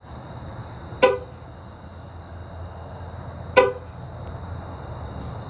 Sound effects > Vehicles
Jeep Wrangler Key Fob Door Lock Horn
The automatic lock horn sound when a key fob is pressed, for a Jeep Wrangler Sahara.